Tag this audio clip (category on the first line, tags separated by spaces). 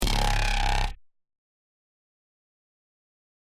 Instrument samples > Synths / Electronic
Bass Electronic Oneshot